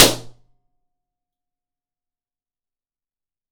Soundscapes > Other
I&R Kitchen Esperaza - 320x300x230cm - OKM1
Subject : An Impulse and response (not just the response.) of my old kitchen in Esperaza, it was kind of odd shaped with the chimeny, none parallel walls so not exacly square, a few corners for the way in and stuff. Date YMD : 2025 July 11 Location : Espéraza 11260 Aude France. Recorded with a Soundman OKM1 Weather : Processing : Trimmed in Audacity.
11260, ballon, balloon, convolution, Convolution-reverb, Esperaza, FR-AV2, FRAV2, Impulse, Impulseandresponse, IR, Kitchen, odd-shaped, omni, pop, Response, Reverb, Tascam